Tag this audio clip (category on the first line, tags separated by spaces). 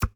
Sound effects > Other
playing cards ui game interface